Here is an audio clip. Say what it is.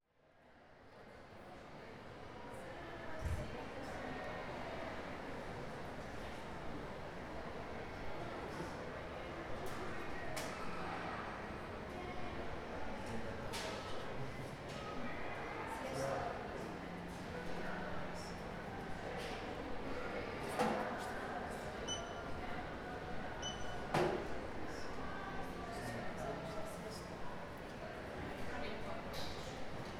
Soundscapes > Indoors
AMBPubic Cinematis CinemaLobby Crowd Hungarian 5 Freebie
Field recording of cinema lobby ambience with moderate crowd activity and background conversations in Hungarian. This is one of the two freebie sounds from my Field Recording | Vol.3 | Cinema Lobby Ambience pack.
Crowd, Freebie, Ambience, Field, Cinema, Hungarian, SFX, recording, Human, Lobby, PostProduction, Movement